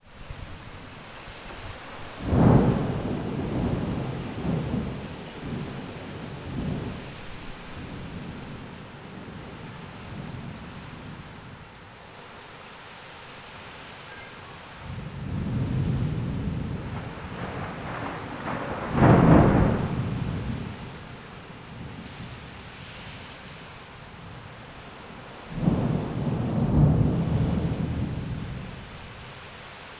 Soundscapes > Nature
Thunderstorm, pt.2
Early in the morning, we are surprised by a very strong thunderstorm that develops and expresses itself violently above our heads with lightning, thunder, strong gusts of wind and pouring rain. The recording took place from the balcony of our room, on the first floor of a small building overlooking Piazza Luigi Salvatore D'Austria, in the town of Lipari on the island of the same name. Now the thunder is much louder and more powerful. The rain has started and is roaring violently on the balcony, on the pavement of the small square it overlooks and on the surrounding houses. The birds have stopped singing, or at least, they sing much less than they did just now. A strong wind can be heard. The chatter of a child and the meow of a lone cat caught in the bad weather. Recorded date: 20/06/2025 at 05:03 with: Zoom H1n with windscreen. Processing: no processing